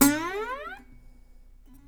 Music > Solo instrument
acoustic guitar slide7
acosutic, chord, chords, dissonant, guitar, instrument, knock, pretty, riff, slap, solo, string, strings, twang